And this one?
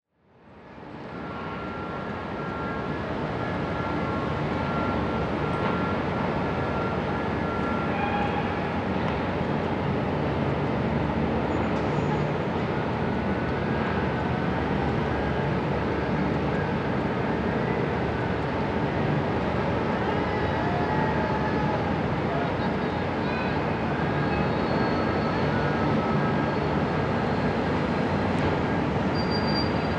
Soundscapes > Urban
Puerto Lejano Valparaiso
Soundscape of Valparaiso's harbour. Distant and general sounds of cranes and machinery.
Harbour, america, south, field, recording, Valparaiso, Chile